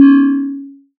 Instrument samples > Synths / Electronic
CAN 1 Db
bass, fm-synthesis